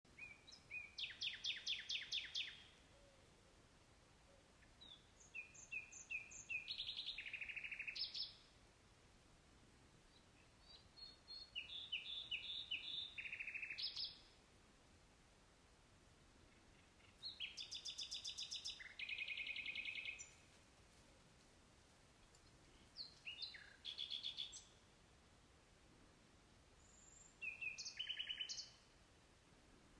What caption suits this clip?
Soundscapes > Indoors
Field recording of a nightingale singing at night in the Tuscan countryside, captured with an iPhone. Despite the modest gear, the bird’s melodic calls are clearly audible, with a calm nocturnal atmosphere in the background. Some natural ambient noise is present (wind, distant insects, occasional rustle), contributing to the realism of the soundscape. Suitable for ambient sound design, documentary use, or background texture in nighttime scenes.
nightingale, forest, Itally, birds, Tuscany, spring, nature, field-recording, bird